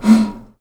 Sound effects > Objects / House appliances
AIRBlow-Blue Snowball Microphone, CU Bottle Nicholas Judy TDC
Air being blown on a bottle.